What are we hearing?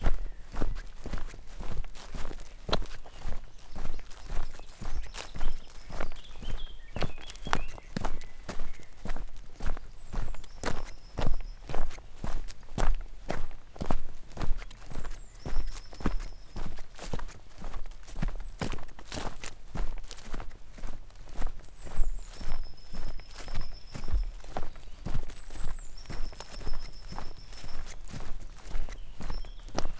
Sound effects > Human sounds and actions
Walking on dirt and a few cobble country side path
Subject : Walking, holding a tripod with a Tascam FR-AV2, Rode NT5 Date YMD : 2025 04 18 Location : Gergueil France. Hardware : Tascam FR-AV2, Rode NT5 WS8 windcover. Weather : Half cloudy half clear. Little to no wind. Processing : Trimmed and Normalized in Audacity.
country-side, dirt, earth, foot-steps, footsteps, FR-AV2, gravel, NT5, path, Rode, Tascam, walking